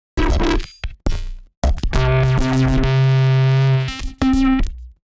Sound effects > Electronic / Design
Optical Theremin 6 Osc Shaper Infiltrated-028
Impulse, SFX, Mechanical, EDM, Loopable, strange, Theremin, Oscillator, Pulse, Robot, Crazy, Noise, Weird, Gliltch, FX, Saw, Analog, Machine, Alien, Robotic, Electro, Synth, Chaotic, Otherworldly, DIY, Tone, Experimental, IDM, Electronic